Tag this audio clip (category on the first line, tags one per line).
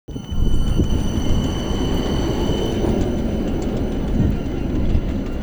Sound effects > Vehicles
rail
tram
vehicle